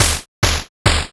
Instrument samples > Percussion
Synthed with phaseplant only. Processed with Khs Bitcrusher, Khs Phase Distortion, Khs Clipper, Khs 3-band EQ, Waveshaper.